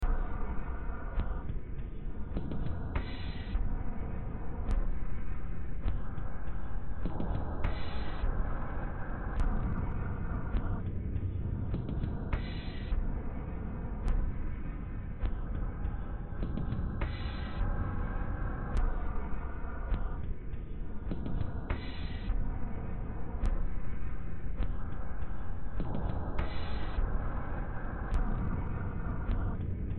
Music > Multiple instruments
Demo Track #3651 (Industraumatic)
Cyberpunk, Games, Noise